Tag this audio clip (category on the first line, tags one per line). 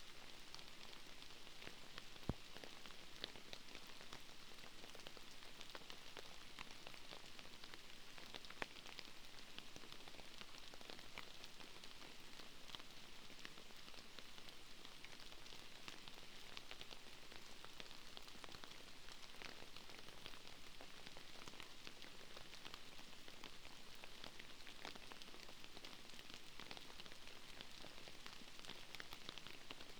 Soundscapes > Nature
natural-soundscape
phenological-recording